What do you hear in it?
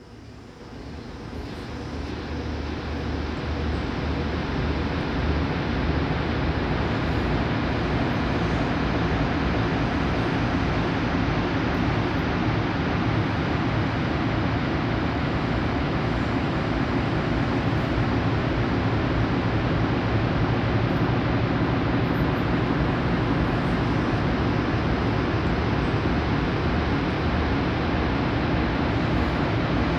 Sound effects > Other
End-of-the-world environment (Ambiente do fim do mundo) no tail
This is an effect to use for: anguish, evil, bad things, shortness of breath, madness, irritation, dread, terror, cemetery, bleeding, and even the end of times.
ambiente, anguish, angustia, dor, environment, Espaco, pain, space, subterrneo, Tensao, tension, terror, underground